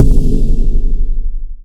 Instrument samples > Percussion
wrong deepcrash 1
An erroneous deepcrash. I kept it because some people like weird/failed sounds.
spock, metal, 2-kHz, high-pass, crashbuilding, Soultone, crash, soundbuilding, metallic, Paiste, clang, smash, splash, sinocymbal, sinocrash, cymbal, Sabian, China, timbre, Meinl, Zultan, Stagg, drumbuilding, trigger, clash, crunch, Zildjian, 2kHz